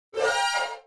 Sound effects > Electronic / Design
TONAL CHORD HIT
From a collection of whooshes made from either my Metal Marshmallow Pro Contact Mic, Yamaha Dx7, Arturia V Collection
whoosh, air, flyby, transision, sound, effect